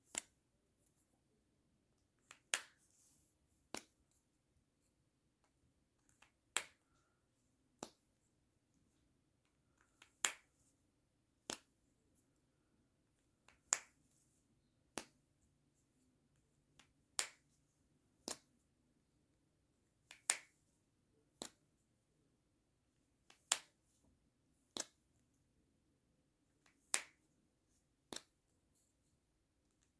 Sound effects > Objects / House appliances
OBJWrite-Samsung Galaxy Smartphone Dry Erase Marker, Cap Top, Open, Close Nicholas Judy TDC
A dry erase marker cap top opening and closing.